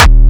Instrument samples > Percussion
Classic Crispy Kick 1-D#
brazilianfunk, distorted, crispy, powerful, Kick, powerkick